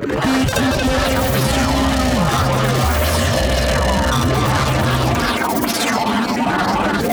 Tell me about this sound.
Sound effects > Electronic / Design
Optical Theremin 6 Osc Destroyed-005
Analog, Instrument, Dub, DIY, SFX, Bass, Synth, Infiltrator, Glitch, Scifi, Sweep, Spacey, Trippy, Sci-fi, Noise, Experimental, Digital, Electronic, Alien, noisey, Electro, FX, Robotic, Glitchy, Robot, Handmadeelectronic, Theremin, Otherworldly, Optical, Theremins